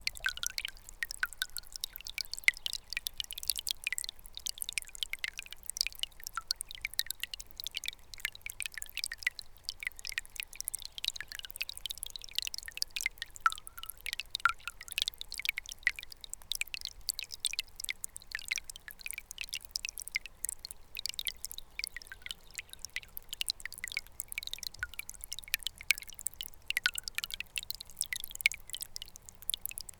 Soundscapes > Nature
Tiny Marble Stream Water
Recorded with Zoom H5 in a forest in switzerland
creek, river, stream, Water, waterstream